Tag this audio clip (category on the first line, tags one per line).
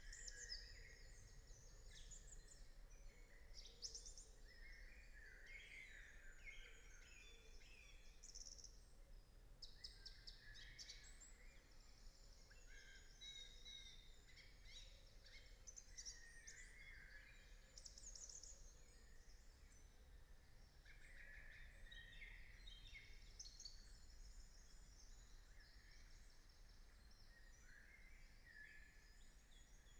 Soundscapes > Nature
alice-holt-forest
data-to-sound
field-recording
sound-installation
nature
Dendrophone
artistic-intervention
weather-data
soundscape
phenological-recording
raspberry-pi
natural-soundscape
modified-soundscape